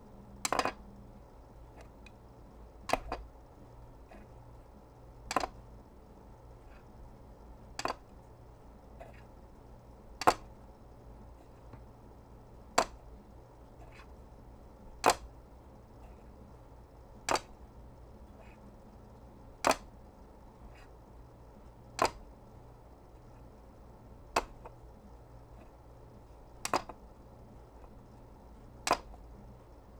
Sound effects > Objects / House appliances
OBJFash-Blue Snowball Microphone, MCU Sunglasses, Pick Up, Set Down Nicholas Judy TDC
Sunglasses being picked up and set down.